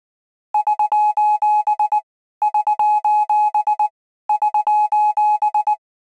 Sound effects > Other mechanisms, engines, machines
Morse Code SOS
This sound was created by Vital to mimic old equipment receiving an SOS distress signal, so it contains some white noise. 这个声音是使用Vital制作的，用于模仿老旧设备接收SOS求教信号，所以有一些白噪音,你可以直接下载而无需任何顾虑。